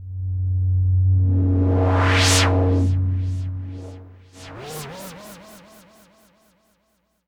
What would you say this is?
Sound effects > Experimental
Analog Bass, Sweeps, and FX-079
robot, scifi, snythesizer, electro, sci-fi, pad, weird, vintage, fx, synth, sfx, mechanical, oneshot, sample, trippy, bass, analogue, retro, bassy, electronic, effect, korg, alien, complex, basses, analog, robotic, machine, dark, sweep